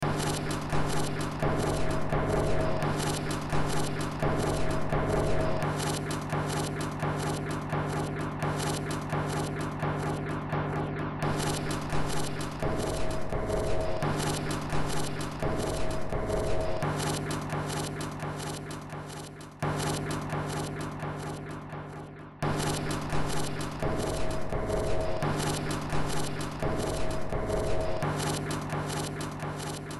Music > Multiple instruments
Short Track #3627 (Industraumatic)
Noise, Soundtrack